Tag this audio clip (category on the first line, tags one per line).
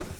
Sound effects > Objects / House appliances
container
household
spill
liquid
clatter
debris
pail
knock
drop
lid
plastic
garden
cleaning
scoop
foley
bucket
handle
shake
carry
kitchen
hollow
tool
water
fill
slam
metal
tip
pour
clang
object